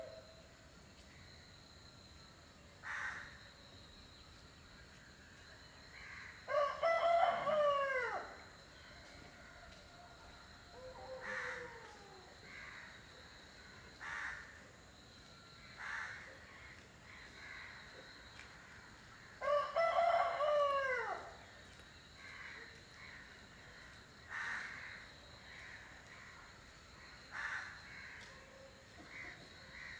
Soundscapes > Other
AMB-Morning,Rooster,Cricket,PeopleWalk,BikePass-Kathmandu,Nepal-11Jul2025,0446H
Morning ambience with rooster, cricket, crow, and other birds, people and motorbike passing - recorded with iPhone 14 internal microphone. Recorded in Satungal, Kathmandu, Nepal.
AMB, Bike, Cricket, Kathmandu, Morning, Nepal, People, Rooster, Walking